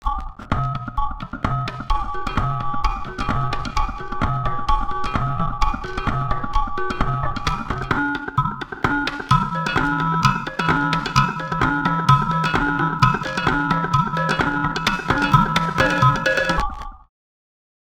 Music > Multiple instruments
An array of saucepans and childrens toys cut together.
Trance, Alien, Dance